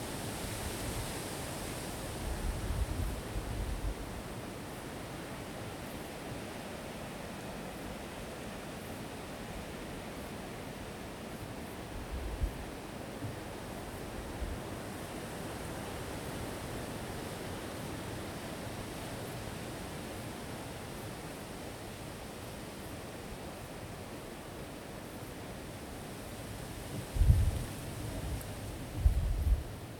Soundscapes > Nature
2025 09 11 00h00 Foret dominale de Detain-Gergueil - Omni mode h2n Q4
Subject : Ambience recording of the Foret dominale de Detain-Gergueil using a zoom H2n in 4channel surround mode. Here combing the sounds into 2 channels in post. Date YMD : 2025 September 11 at 00:00 Location : Gergueil 21410 Bourgogne-Franche-Comte Côte-d'Or France Hardware : Zoom H2n. With a freezer bag to protect it against rain and a sock over it for a wind-cover. Mounted up a tree on a Small rig magic arm. Weather : Processing : Trimmed and normalised in Audacity, mixed the front L/R and back L/R into this "surround" recording. Notes : Tips : Multiple other recordings throughout the night.